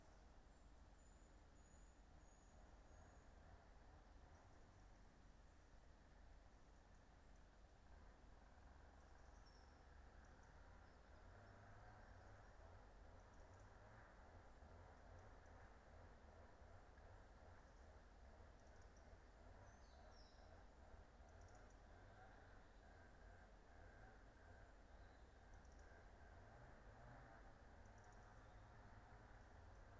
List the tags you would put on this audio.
Soundscapes > Nature
weather-data
sound-installation
alice-holt-forest
nature
artistic-intervention
raspberry-pi
natural-soundscape
phenological-recording
field-recording
modified-soundscape
data-to-sound
soundscape
Dendrophone

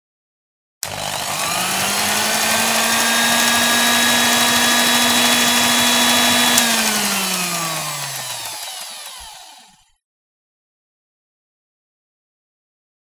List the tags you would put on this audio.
Sound effects > Objects / House appliances
motor
mixer
kitchen
speed

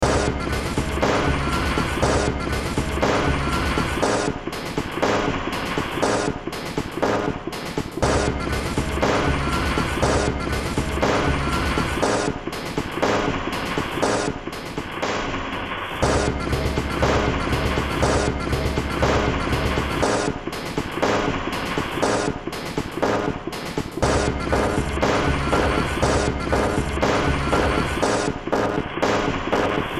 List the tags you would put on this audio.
Music > Multiple instruments
Ambient
Soundtrack
Industrial
Underground
Games
Sci-fi
Horror
Noise
Cyberpunk

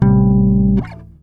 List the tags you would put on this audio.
Instrument samples > String
charvel
fx
oneshots
plucked
riffs
rock
slide

